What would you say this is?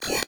Sound effects > Electronic / Design
RGS-Glitch One Shot 6
Noise, Glitch, Effect, FX, One-shot